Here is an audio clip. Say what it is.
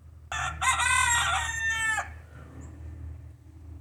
Sound effects > Animals
Fowl - Chicken, Rooster Crow
A rooster crowing. Recorded with an LG Stylus 2022. Can be used for morning scenes (this was recorded in the afternoon btw).
cock, barnyard, poultry, chicken, rooster, fowl, crow, wake-up, farm, morning, dawn, bird, wake